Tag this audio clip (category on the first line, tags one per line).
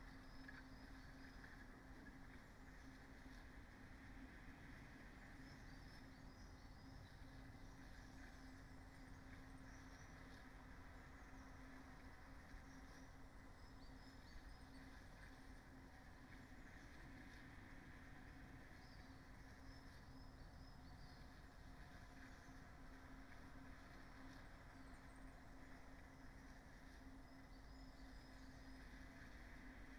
Soundscapes > Nature
sound-installation
natural-soundscape